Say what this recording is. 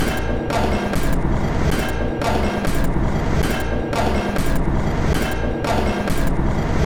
Instrument samples > Percussion
This 140bpm Drum Loop is good for composing Industrial/Electronic/Ambient songs or using as soundtrack to a sci-fi/suspense/horror indie game or short film.
Packs, Dark, Weird, Samples, Industrial, Underground, Loop, Loopable, Soundtrack, Alien, Ambient, Drum